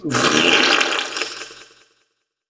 Sound effects > Human sounds and actions
Big and wet fart
I ate taco bell but my stomach did not like it.
Disgusting, Wet, Horror, Farting, Weird, Poop, Crazy